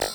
Sound effects > Electronic / Design
RGS-Glitch One Shot 7
Effect, FX, Glitch, Noise, One-shot